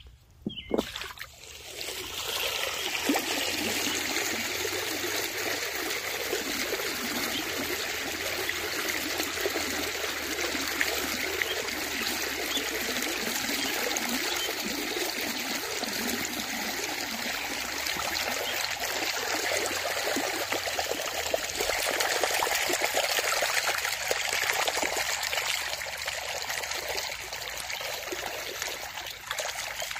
Soundscapes > Nature
At the Creek-Nature Sounds tr2(2)
This sound captures the ambiance soundscape of the creek with water flowing over rocks and faint birds chirping in the background.